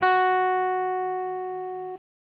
Instrument samples > String
Random guitar notes 001 FIS4 03
electric, guitar, stratocaster, electricguitar